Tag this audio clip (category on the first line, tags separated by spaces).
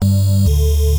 Sound effects > Electronic / Design
alert button Digital Interface menu message notification options UI